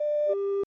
Sound effects > Electronic / Design
Short “Roger” radio confirmation beep, inspired by military and walkie-talkie communication devices. Suitable for games, films, simulations, UI feedback, and sci-fi or tactical sound design. Credit is not required, but if you choose to mention the creator, "Starod Games" is appreciated.